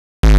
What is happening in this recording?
Instrument samples > Synths / Electronic
Hardstyle Rumble Bass 1 Re-pitched
Sample used ''SFX Chunk Thud'' from Flstudio original sample pack. Processed with Fruity Limiter, Plasma. I think you can use it to make some PVC Kicks.
Bass, Distorted, Hardstyle, PvcKick, Rawstyle, Rumble